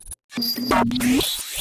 Sound effects > Experimental
Gritch Glitch snippets FX PERKZ-015
alien, percussion, experimental, sfx, impact, whizz, crack, abstract, zap, pop, clap, otherworldy, laser, fx, snap, hiphop, glitch, idm, glitchy, perc, lazer, impacts, edm